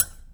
Sound effects > Objects / House appliances
knife and metal beam vibrations clicks dings and sfx-115
Beam, Clang, ding, Foley, FX, Klang, Metal, metallic, Perc, SFX, ting, Trippy, Vibrate, Vibration, Wobble